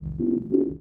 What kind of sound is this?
Sound effects > Electronic / Design
Digital UI SFX created using Phaseplant and Portal.
alert, confirmation, digital, interface, message, selection